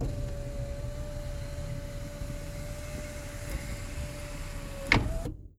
Sound effects > Vehicles
An electric car sunroof closing.